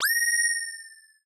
Sound effects > Electronic / Design
HARSH CIRCUIT MULTIMEDIA TONE
INNOVATIVE, SHARP, UNIQUE, CIRCUIT, ELECTRONIC, BEEP, BOOP, COMPUTER, HARSH, OBSCURE, CHIPPY, DING, SYNTHETIC, HIT, EXPERIMENTAL